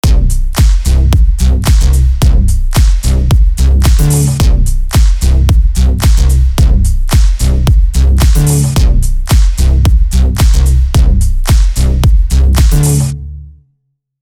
Music > Multiple instruments
Ableton Live. VST.Serum......Drum and Bass Free Music Slap House Dance EDM Loop Electro Clap Drums Kick Drum Snare Bass Dance Club Psytrance Drumroll Trance Sample .
Bass, Clap, Dance, Drum, Drums, EDM, Electro, Free, House, Kick, Loop, Music, Slap, Snare